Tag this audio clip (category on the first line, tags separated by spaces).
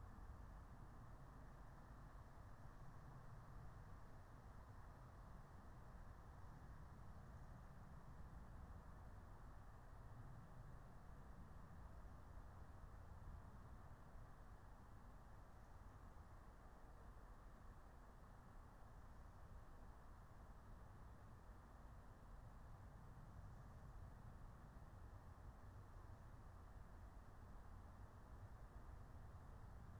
Soundscapes > Nature
alice-holt-forest meadow natural-soundscape nature phenological-recording raspberry-pi soundscape